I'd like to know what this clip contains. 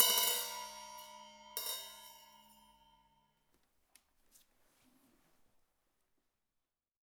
Music > Solo instrument
Cymbal hit with knife-008
Metal, Custom, Crash, Perc, Percussion, Sabian, Kit, Cymbals, Cymbal